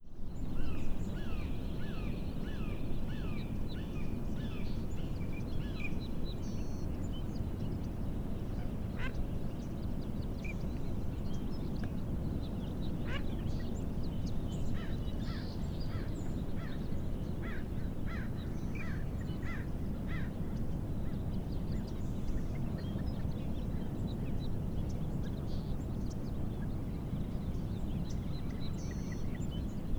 Soundscapes > Nature
birds by the bay - los osos - 01.02.24
Birds chatter and flutter. Los Osos, California Recorded with Zoom H6 xy mic or ms I don't remember which